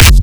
Instrument samples > Percussion
BrazilFunk Kick 16 Processed-1
BrazilFunk, Brazilian, BrazilianFunk, Distorted, Kick